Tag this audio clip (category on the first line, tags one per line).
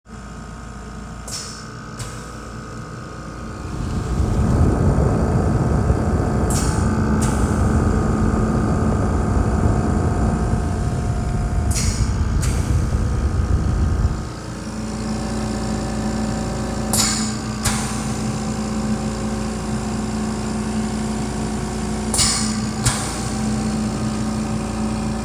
Sound effects > Objects / House appliances
air
cpap